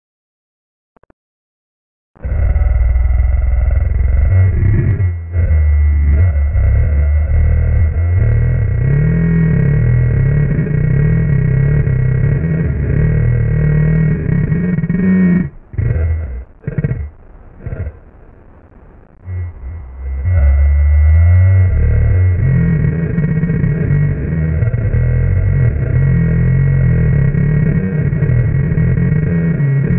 Sound effects > Other
The land whale is a fictional whale like creature that walks on land.